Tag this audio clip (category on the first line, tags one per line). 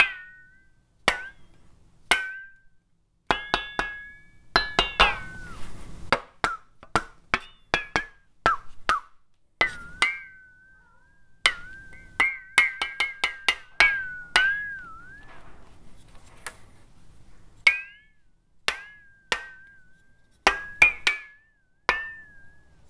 Sound effects > Other mechanisms, engines, machines
vibe
percussion
saw
handsaw
metallic
twang
shop
household
hit
vibration
twangy
sfx
smack
tool
perc
fx
plank
foley
metal